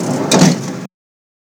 Sound effects > Objects / House appliances
Register Close
cash, cashier, close, lofi, money, register, shove, shut, vintage